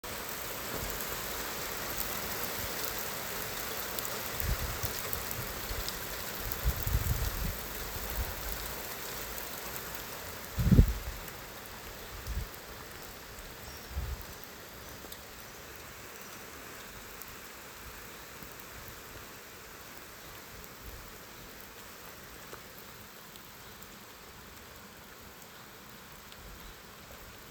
Soundscapes > Urban
Rain Sound
rainstorm in a small town